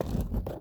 Sound effects > Objects / House appliances
Folding Fan - Opening
Recorded on my phone (Galaxy Note10+).
open, fan